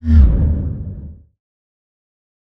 Sound effects > Other
Sound Design Elements Whoosh SFX 052
Effects recorded from the field.